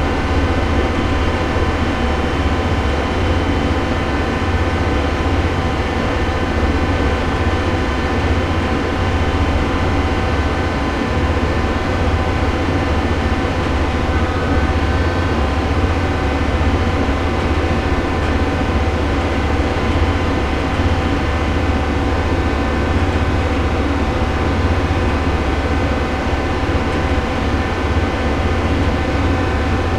Sound effects > Other mechanisms, engines, machines
Ferryboat engine and exhaust system at cruise speed. Recorded between Calapan city and Batangas city (Philippines), in August 2025, with a Zoom H5studio (built-in XY microphones).